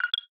Sound effects > Electronic / Design
Random UI Sounds 5
All sample used from bandlab. I just put a drumfill into phaseplant granular, and used Flsudio ''Patcher'' plugin to add multiple phaser, Vocodex, and flanger, because I was really boring. Extra plugin used to process: OTT.